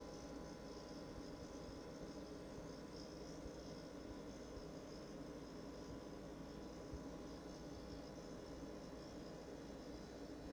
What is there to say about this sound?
Sound effects > Objects / House appliances
Refrigerator Running
Sound of a Fridge running. Recorded with a ZOOM H6 and a Sennheiser MKE 600 Shotgun Microphone. Go Create!!!
Fridge, House, Refrigerator, Appliances, Compressor